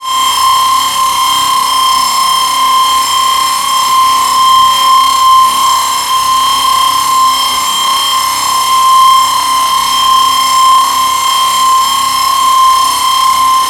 Sound effects > Electronic / Design
I synth it with phasephant and 3xOSC! I was try to synth a zaag kick but failed, then I have a idea that put it into Granular to see what will happen, the result is that I get this sound.